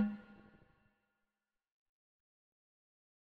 Music > Solo percussion
Snare Processed - Oneshot 89 - 14 by 6.5 inch Brass Ludwig
snaredrum, realdrums, oneshot, fx, kit, hit, roll, rimshots, hits, perc, ludwig, percussion, snareroll, realdrum, processed, snare, drums, snares, brass, rim, drumkit, reverb, beat, flam, rimshot, acoustic, crack, sfx, drum